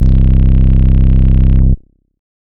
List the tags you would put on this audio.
Instrument samples > Synths / Electronic
bass vst synth vsti

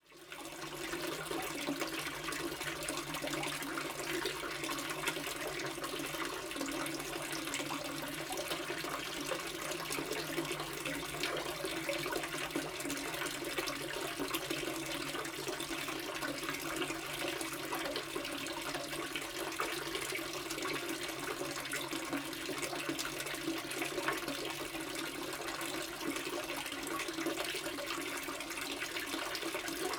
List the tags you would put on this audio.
Soundscapes > Nature
running countryside

